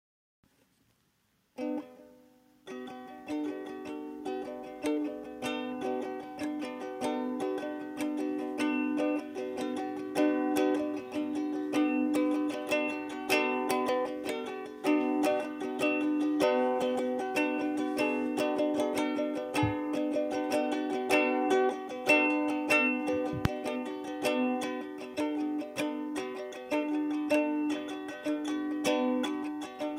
Music > Solo instrument
Mi tropicalia
Just a quickly recorded ukulele tune with Tropicalia vibes.
Ukulele, lofi, Tropical